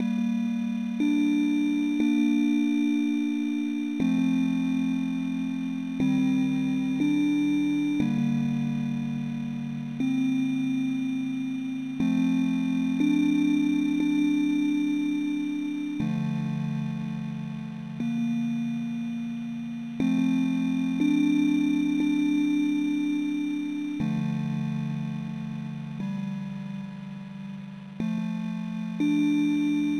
Music > Solo percussion
Sad low-fi [LOOP]
Sad soundtrack you can loop 2026
soundtrack, audio, sad, loopable, music, lofo, lofi